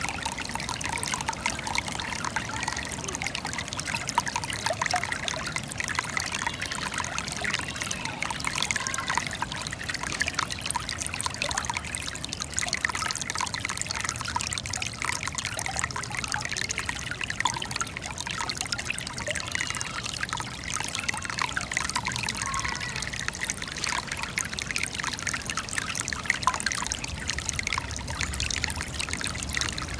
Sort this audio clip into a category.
Soundscapes > Nature